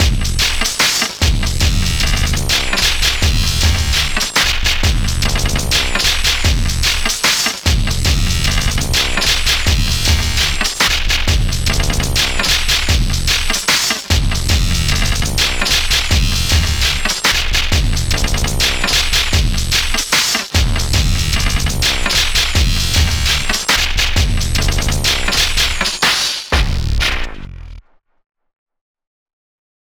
Music > Other
tentieth break vCHANGE 149
breaks breaks breaks 149 bpm